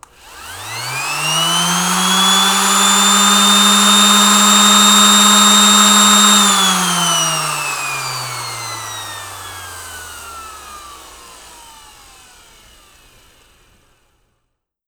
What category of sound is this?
Sound effects > Objects / House appliances